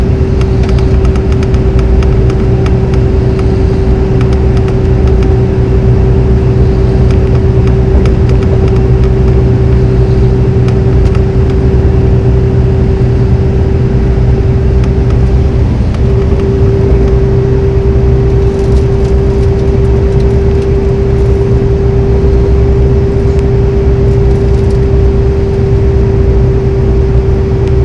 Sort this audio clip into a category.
Soundscapes > Urban